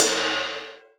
Instrument samples > Percussion
Old crashfiles low-pitched, merged and shrunk in length.